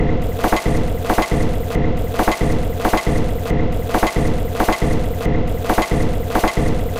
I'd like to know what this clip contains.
Instrument samples > Percussion
This 137bpm Drum Loop is good for composing Industrial/Electronic/Ambient songs or using as soundtrack to a sci-fi/suspense/horror indie game or short film.
Weird, Packs, Industrial, Drum, Samples, Underground, Alien, Loopable, Loop, Ambient, Dark, Soundtrack